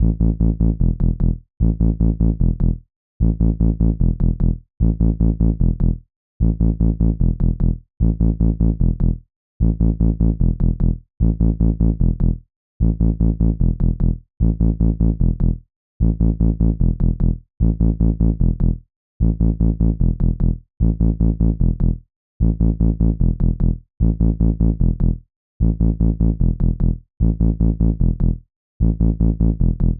Music > Solo instrument
anarcy bass loop
bass, pulse, sub